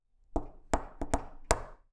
Sound effects > Objects / House appliances
Knocking melody on a wood desk. I recorded this with a zoom audio recorder.
knock, knocking, melody, wood